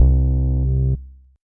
Instrument samples > Synths / Electronic
VSTi Elektrostudio (2xModel Mini+Model Pro)